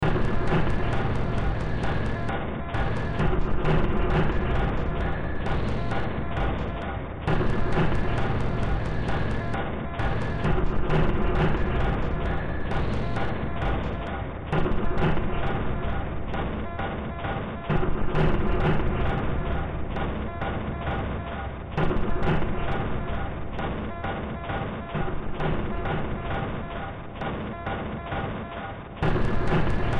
Music > Multiple instruments
Demo Track #3344 (Industraumatic)
Underground, Soundtrack, Industrial, Sci-fi, Noise, Ambient, Games, Cyberpunk, Horror